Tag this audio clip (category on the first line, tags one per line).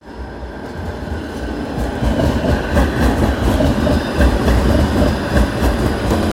Sound effects > Vehicles
rain
tampere
tram